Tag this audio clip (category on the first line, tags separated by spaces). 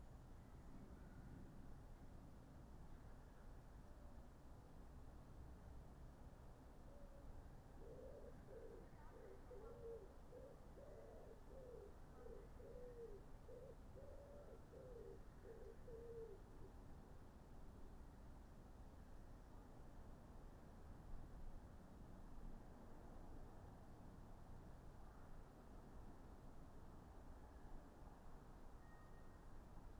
Soundscapes > Nature
alice-holt-forest data-to-sound Dendrophone field-recording modified-soundscape nature phenological-recording weather-data